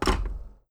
Sound effects > Objects / House appliances
COMTelph-Blue Snowball Microphone Nick Talk Blaster-Telephone, Receiver, Hang Up 01 Nicholas Judy TDC
A telephone receiver being hung up.